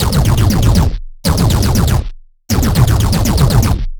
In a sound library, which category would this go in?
Sound effects > Other